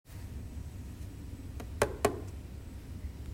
Sound effects > Human sounds and actions
Sound of knocking on wood table